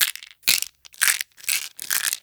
Sound effects > Objects / House appliances
Pill Bottle Shake 8
drugs, meds, Pills, lab, plastic, pill, doctors, bottle